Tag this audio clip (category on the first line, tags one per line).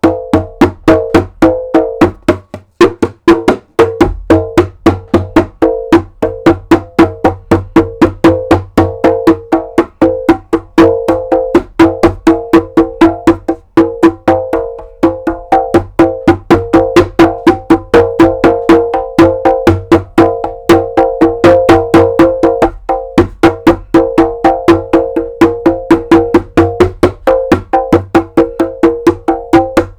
Music > Solo percussion
african
Blue-brand
Blue-Snowball
djembe
jembay
jembe
jungle
music
play